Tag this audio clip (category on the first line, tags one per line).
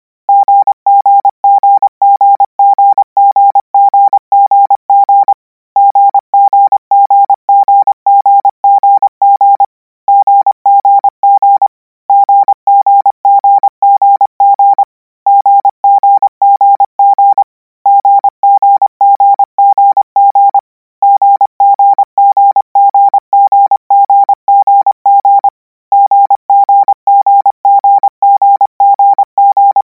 Sound effects > Electronic / Design
code
codigo
letters
morse
radio